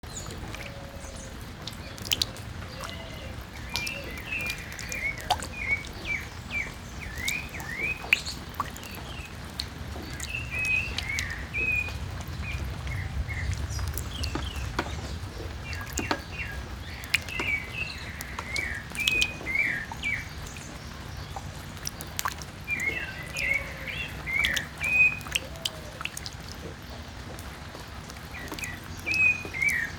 Soundscapes > Nature
Rain falling into a puddle and birds in the background
Recorded with a Rode NTG microphone in my backyard during a light drizzle
argentina, birds, lluvia, pajaros, rain